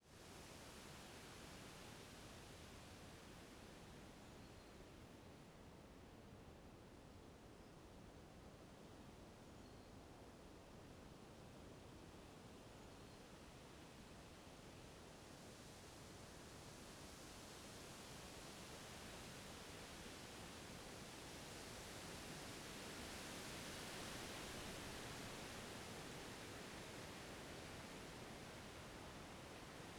Soundscapes > Nature
Ambient. Autumn forest. Park. Growing wind. Bird.
birds, field-recording, forest, nature, wind